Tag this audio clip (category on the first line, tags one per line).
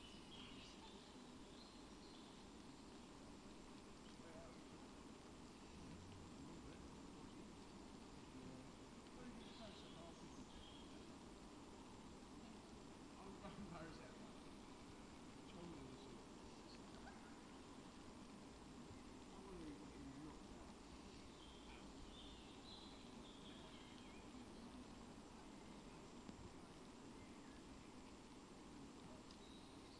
Soundscapes > Nature
weather-data
sound-installation
artistic-intervention
data-to-sound
soundscape
raspberry-pi
nature
phenological-recording
field-recording
alice-holt-forest
Dendrophone
modified-soundscape
natural-soundscape